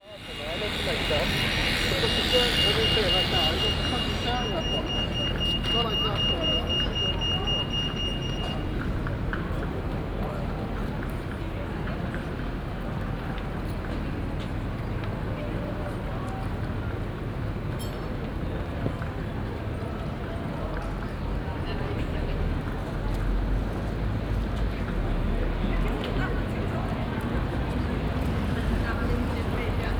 Soundscapes > Urban
Cardiff - Walking Through Town, Towards Womanby St 01
Cardiff, City, Citycentre, urban, fieldrecording